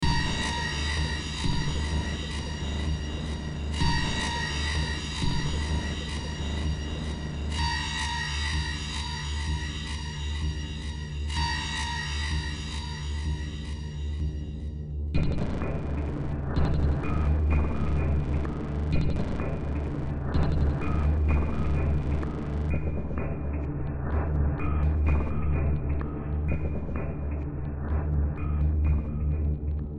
Music > Multiple instruments
Demo Track #3391 (Industraumatic)
Ambient, Cyberpunk, Games, Horror, Industrial, Noise, Sci-fi, Soundtrack, Underground